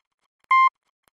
Sound effects > Electronic / Design
A series of beeps that denote the letter T in Morse code. Created using computerized beeps, a short and long one, in Adobe Audition for the purposes of free use.